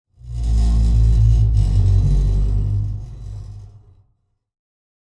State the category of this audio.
Instrument samples > Other